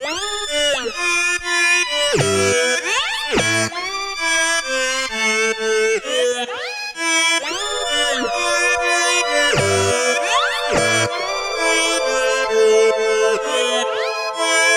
Music > Other
Experimental Sound 130bpm 8bar Loop
Made with FLEX in Fl Studio
dubstep, sounddesign, 130bpm, loop, experimental, absurd, 8bar, electronic, weird, contrabass, special, bass